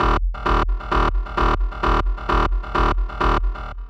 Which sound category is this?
Sound effects > Experimental